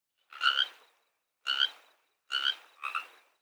Sound effects > Animals
Small Frog Croaks
One small frog isolated from the bunch. Recorded with a shotgun mic in Ojai, CA, noise reduced with izotope RX.
animal, croak, croaking, field-recording, Frog, frogs, nature, night